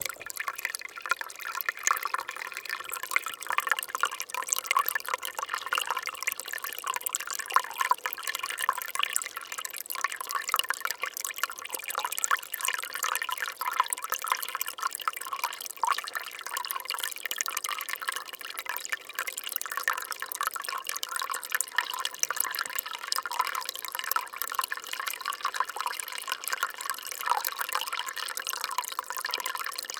Soundscapes > Nature
Low Marble Stream
Recorded with Zoom H5 in a forest in switzerland
drops, stream, trickle, waterstream, river